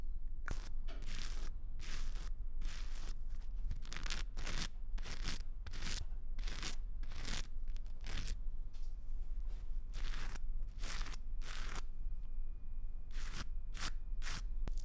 Soundscapes > Other
nails scratching green side of sponge to imitate hair brushing